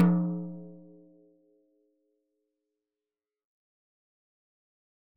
Music > Solo percussion
Hi Tom- Oneshots - 35- 10 inch by 8 inch Sonor Force 3007 Maple Rack
flam, instrument, acoustic, tomdrum, perc, fill, drumkit, drum, beatloop, percussion, beats, tom, toms, oneshot, rimshot, rim, studio, roll, velocity, beat, hitom, percs, hi-tom, kit, drums